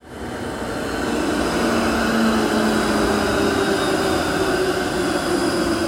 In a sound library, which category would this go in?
Sound effects > Vehicles